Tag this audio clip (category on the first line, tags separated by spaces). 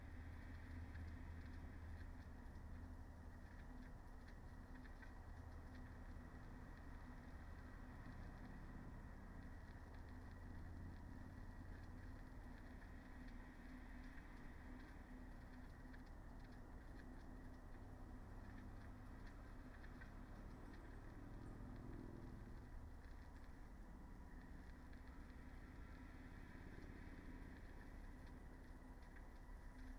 Soundscapes > Nature
field-recording phenological-recording raspberry-pi soundscape natural-soundscape weather-data alice-holt-forest Dendrophone modified-soundscape artistic-intervention sound-installation nature data-to-sound